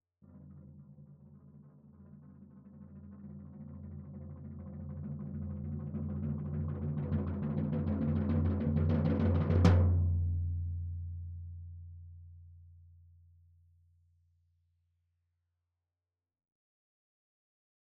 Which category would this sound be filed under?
Music > Solo percussion